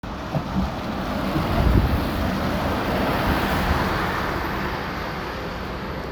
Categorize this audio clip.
Sound effects > Vehicles